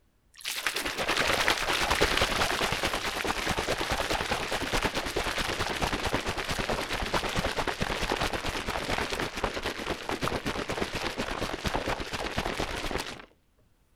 Soundscapes > Indoors
Mixing instant drink powder in a shaker - long version -
Recorded the sound of mixing instant drink powder in a shaker. Recorded with a Zoom H1n and Movo X1-Mini.
water, instant, drink, shaker, mixing, MovoX1mini, powder, H1n, shaking